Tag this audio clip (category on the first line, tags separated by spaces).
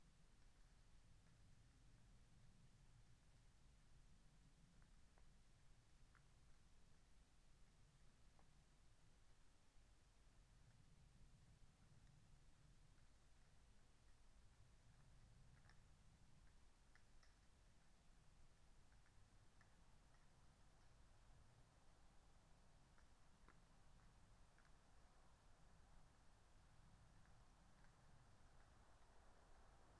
Soundscapes > Nature
natural-soundscape field-recording weather-data soundscape raspberry-pi Dendrophone alice-holt-forest phenological-recording modified-soundscape nature data-to-sound sound-installation artistic-intervention